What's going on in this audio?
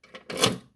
Sound effects > Objects / House appliances
inserting key 2
Here is a sound of me inserting a small key into a small container.
Insert, key, metal, metallic